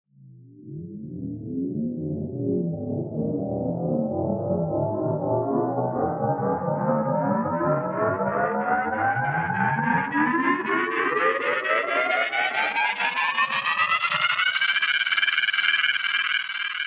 Sound effects > Electronic / Design
Aquatic Riser/Powerup
Made in LMMS using 3xOsc and a sh** ton of effects.
alien, aquatic, riser, sweep